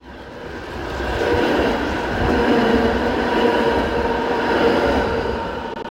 Soundscapes > Urban
Tram passing Recording 43
The sound comes from a tram moving along steel rails, produced mainly by wheel–rail contact, the electric drive, and braking systems. It is characterized by a low-frequency rolling rumble, and rhythmic rail noise with occasional high-pitched braking squeals as the tram passes. The recording was made outdoors near a tram line in Hervanta, Tampere, using recorder in iPhone 12 Pro Max. The purpose of the recording is to provide a clear example of a large electric vehicle pass-by for basic audio processing and movement-related sound analysis.
Rail, Train, Tram